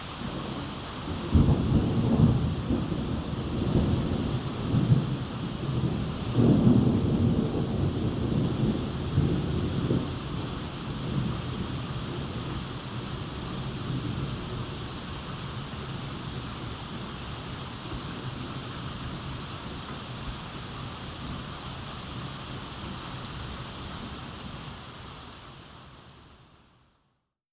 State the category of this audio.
Sound effects > Natural elements and explosions